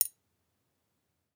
Sound effects > Other mechanisms, engines, machines
Spanner Click 01
tool, sample, spanner, noise, click